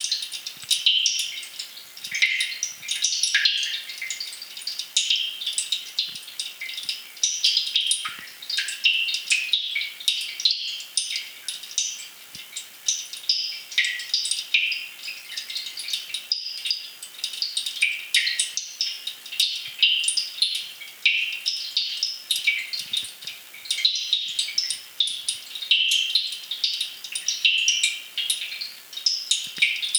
Soundscapes > Indoors
Heater Waterdrops ContactMic
Waterdrops inside my heater. Recorded with a Contact Microphone and a Tascam Recorder. Editing: EQ and Compression.
Heater, Droplets, Water, Drops, Contact, ContactMicrophone